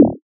Instrument samples > Synths / Electronic
BWOW 4 Eb
additive-synthesis, bass